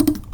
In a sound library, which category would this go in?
Sound effects > Objects / House appliances